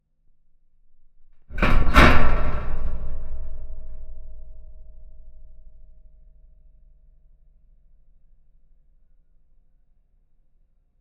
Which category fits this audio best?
Sound effects > Other